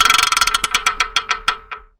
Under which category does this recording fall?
Sound effects > Objects / House appliances